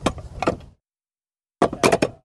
Sound effects > Objects / House appliances
COMTelph-CU Telephone, Vintage, Pick Up, Hang Up Nicholas Judy TDC
A vintage telephone picking up and hanging up. Recorded at the Military Aviation Museum in Virginia Beach.